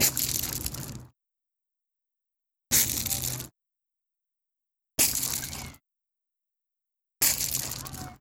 Sound effects > Natural elements and explosions
ROCKCrsh-Samsung Galaxy Smartphone, CU Small Stones, Kicked, X4 Nicholas Judy TDC
Kicking small stones. Four takes. Recorded at Pole Green Produce.